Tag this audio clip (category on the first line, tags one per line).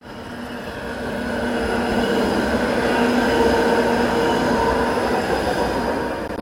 Sound effects > Vehicles
rain
tampere
tram